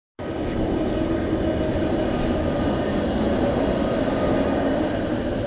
Soundscapes > Urban
Tampere tram recording